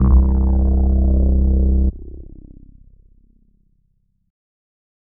Instrument samples > Synths / Electronic
VSTi Elektrostudio (Model Mini+Micromoon+Model Pro)
vst, vsti, bass, synth